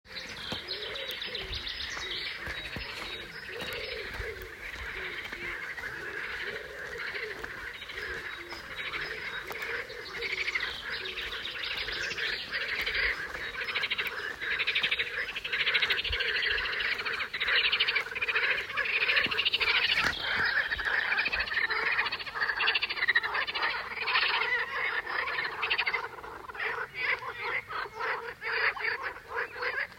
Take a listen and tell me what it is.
Soundscapes > Nature
Grenouilles / frogs
walking to a pond in france frogs croaking you can listen turtledove & birds recorded with my iphone
birds, croak, turtledove, frog, fleuve, france